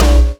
Instrument samples > Percussion
snare 1950 1d long
6x13, 6x13-inch, antipersonocracy, atheism, beat, corpsegrind, death, death-metal, distorted, distortion, drum, drum-kit, drum-loop, drums, DW, extremophile, gore, groovy, logicogony, mainsnare, metal, percussion, rhythm, sample, side, side-drum, snare, snare-drum